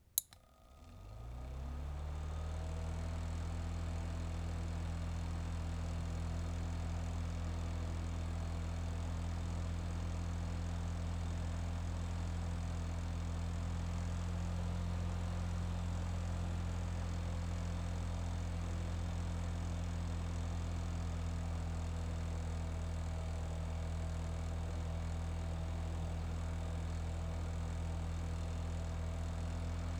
Sound effects > Objects / House appliances
Subject : Recording small and "sound broken" desk fan. A 4 blade 9.5cm blade to blade (Diameter) fan. Date YMD : 2025 July 03 Location : Albi 81000 Tarn Occitanie France. Indoors. Sennheiser MKE600 with stock windcover P48, no filter. Weather : Processing : Trimmed in Audacity.